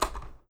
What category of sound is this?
Sound effects > Objects / House appliances